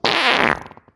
Sound effects > Other
Genuine fart recorded with smartphone.